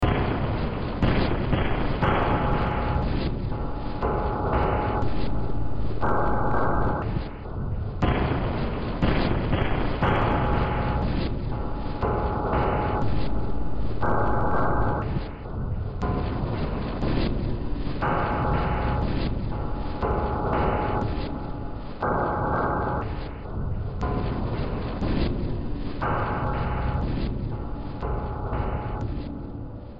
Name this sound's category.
Music > Multiple instruments